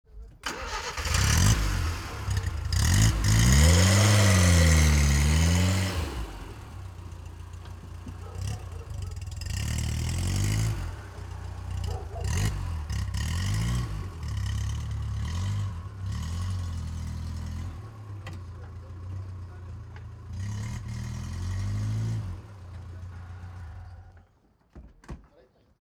Sound effects > Vehicles
Outside POV from a FIAT 600 engine start. Recorded with: Sound Devices Mix-Pre 6-II, Sennheiser MKH 416.